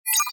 Sound effects > Electronic / Design
UI undo sound effect
This sound was made and processed in DAW using only my samples and synths; - Some click/undo/pressing sound effect for user interfaces or whatever comes to your mind. - Two synths + phaser processing was utilised. For this one I copied another (my own - "UI action sound effect") sound, just reversed it and pitch-shifted, so more ditailed info is there. - Ы.
button, press, user-interface, UI, redo, application, interface, undo, desktop, sci-fi, click, switch